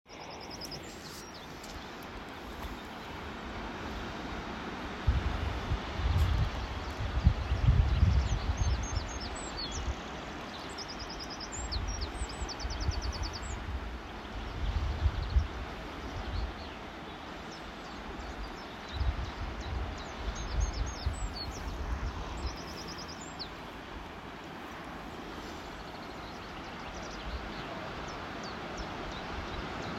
Soundscapes > Nature
This was recorded in Cornwall by the sea - bird song and waves - Recorded on iPhone 14.